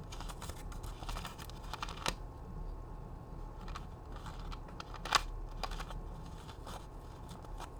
Sound effects > Objects / House appliances
Screwing and unscrewing a lid of an empty soda bottle.